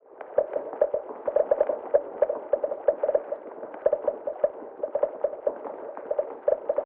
Sound effects > Objects / House appliances
Hi ! That's not recording sound :) I synth it with phasephant!